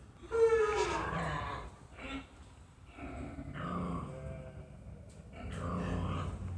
Sound effects > Animals
Ever wondered what the non-avian dinosaurs could have sounded like? This pig groaning can be used for dinosaurs. Fun Fact: Some stock dinosaur vocalizations were made by doctoring pig squeals. Recorded with an LG Stylus 2022.